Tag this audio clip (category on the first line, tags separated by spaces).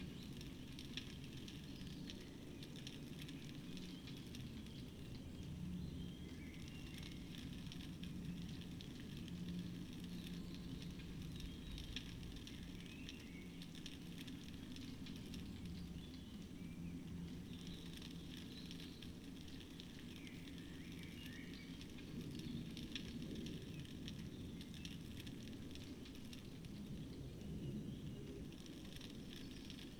Soundscapes > Nature
Dendrophone phenological-recording artistic-intervention natural-soundscape